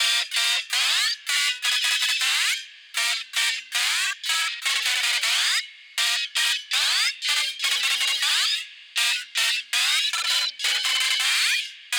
Music > Solo instrument
guitar high sound with slide
a sound made with a guitar
riser, slide